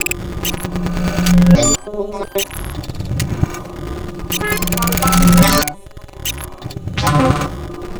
Sound effects > Experimental
This pack focuses on sound samples with synthesis-produced contents that seem to feature "human" voices in the noise. These sounds were arrived at "accidentally" (without any premeditated effort to emulate the human voice). This loop was created with help from Sonora Cinematic's incredible 'Harmonic Bloom' tool, which extracts harmonics from "noisy" source material. This process is another one which often results in chattering or "conversational" babble that approximates the human voice.
120bpm, apophenia, Harmonic-Bloom, harmonic-extractor, loop, pareidolia, shaped-noise, vocal